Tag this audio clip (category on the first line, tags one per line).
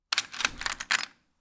Sound effects > Other mechanisms, engines, machines
bang
bop
fx
knock
pop
rustle
sfx
sound
thud
tink
wood